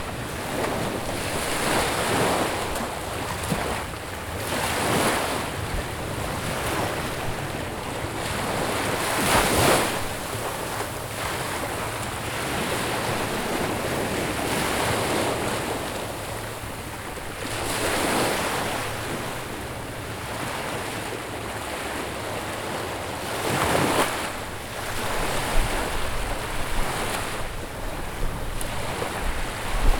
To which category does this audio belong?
Soundscapes > Nature